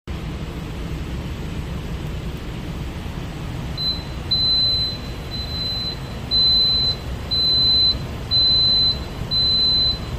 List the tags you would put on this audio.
Sound effects > Other mechanisms, engines, machines
Alarm beeping Machinery

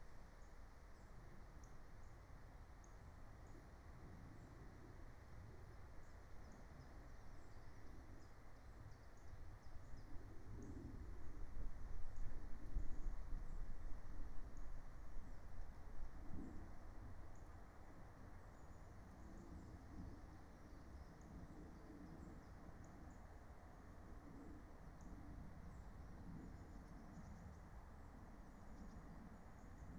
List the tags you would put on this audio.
Soundscapes > Nature
nature raspberry-pi soundscape

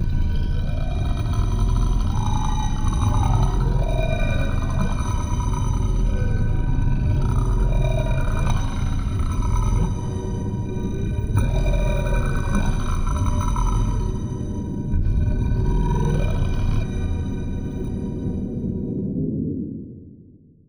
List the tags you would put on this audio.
Sound effects > Experimental
Animal gutteral Groan Monstrous demon Monster Frightening Sound Deep Vocal Echo visceral evil Snarling Snarl boss Otherworldly fx sfx Ominous scary Fantasy Sounddesign Vox gamedesign devil Growl Reverberating Alien Creature

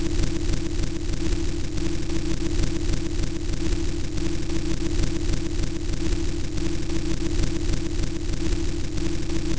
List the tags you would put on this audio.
Instrument samples > Percussion
Dark Weird

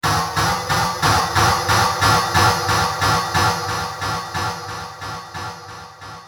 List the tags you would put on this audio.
Music > Other
Distorted Distorted-Piano Piano

